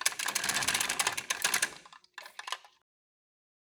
Sound effects > Other mechanisms, engines, machines
Pull Chain-02
Pull-chain on a loading door mechanism
chain, gears, loadingdoor, machinery, mechanical